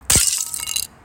Sound effects > Other
Ice Shatter SFX
Recorded March 24, 2024. The sound was produced by dropping shards of ice resembling glass onto the concrete path of the park the shatter was recorded at. The audio recording is unedited.
glass, break, ice, Shatter